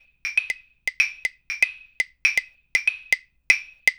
Music > Solo percussion
Three Claves-2
loop, claves, drum, loops, pack, 120BPM, drums, clave